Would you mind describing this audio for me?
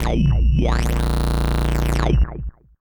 Sound effects > Experimental
Analog Bass, Sweeps, and FX-144
robot, sfx, retro, pad, snythesizer, machine, dark, vintage, trippy, synth, alien, electro, sweep, mechanical, bassy, analog, korg, robotic, complex, weird, oneshot, effect, electronic, analogue, sci-fi, sample, fx, scifi, bass, basses